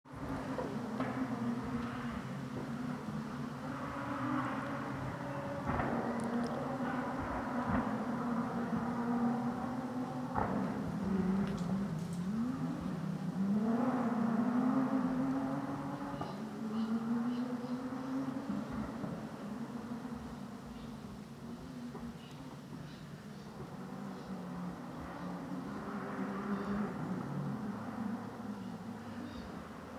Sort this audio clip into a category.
Sound effects > Vehicles